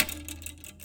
Sound effects > Other mechanisms, engines, machines
Woodshop Foley-011
oneshot, rustle, little, fx, wood, thud, tink, crackle, bam, bang, pop, bop, tools, shop, knock, foley, sfx, strike, metal, sound, boom, percussion, perc